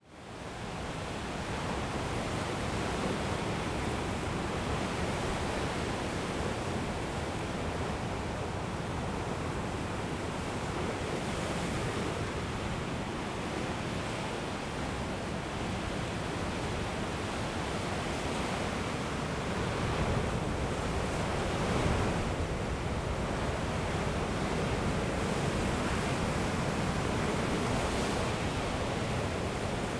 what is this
Soundscapes > Nature
Recording of 10 Beaufort wind waves, storm conditions with very high waves (29-41 feet), dense foam streaks, and reduced visibility at sea. 10 Beaufort winds are equivalent to 55-63 mph or 89-102 km/h. This is a field recording, on the island of Tinos, Greece. Recorder used: ZOOM H2essential in a special foam-protected housing to guard the equipment from the wind and the sea spray.